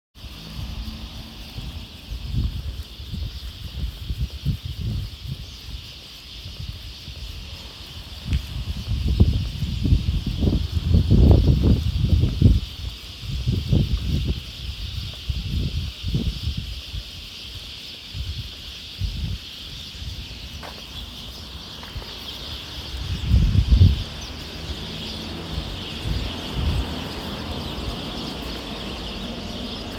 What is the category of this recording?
Soundscapes > Nature